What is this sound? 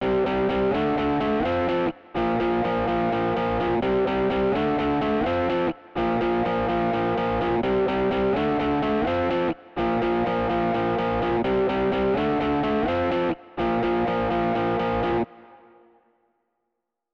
Music > Solo instrument
Guitar loops 110 05 verison 05 126 bpm
Otherwise, it is well usable up to 4/4 126 bpm.
music, simple, electricguitar, free, electric, loop, guitar, reverb, samples, bpm, pianomusic, simplesamples